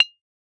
Sound effects > Objects / House appliances
Masonjar Screw 2 Hit
Hitting the rim of a glass mason jar with a metal screw, recorded with an AKG C414 XLII microphone.
glass, hit, mason-jar